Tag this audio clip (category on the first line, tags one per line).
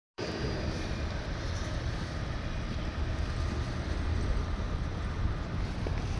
Soundscapes > Urban
recording
Tampere